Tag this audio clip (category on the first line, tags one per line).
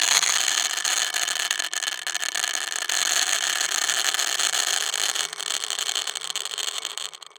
Sound effects > Objects / House appliances
contact
Rice
leaf-audio
cup
Grains